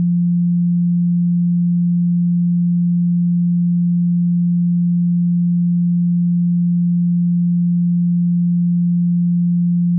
Sound effects > Other
174 hz pure frequency
Grounding & Physical Healing Type: Sacred Solfeggio Tone
tones, gong, Relax